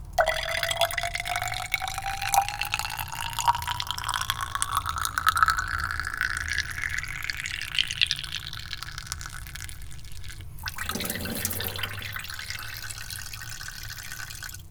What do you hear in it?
Sound effects > Objects / House appliances
glass beaker small filling with water foley-004
bonk, clunk, drill, fieldrecording, foley, foundobject, fx, glass, hit, industrial, mechanical, metal, natural, object, oneshot, perc, percussion, sfx, stab